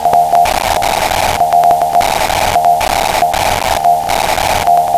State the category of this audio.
Sound effects > Experimental